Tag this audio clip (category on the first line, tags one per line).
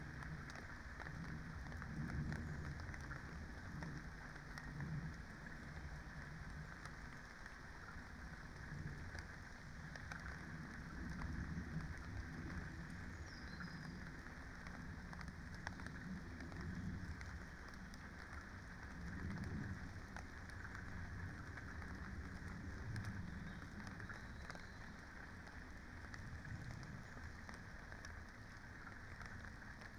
Soundscapes > Nature
sound-installation
field-recording
data-to-sound
natural-soundscape
soundscape
alice-holt-forest
nature
phenological-recording
raspberry-pi
weather-data
Dendrophone
artistic-intervention
modified-soundscape